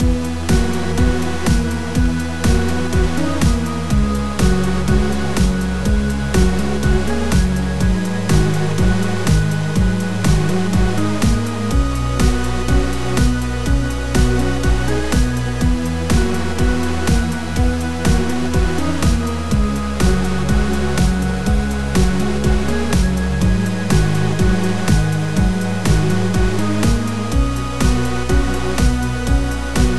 Music > Multiple instruments
B major / F# Mixolydian | 123bpm | 4/4 F# Mixolydian uses the same notes as B major. Music theory treats them as different modes, but this loop will work perfectly well in compositions written in B major. I’ll be happy to adjust them for you whenever I have time!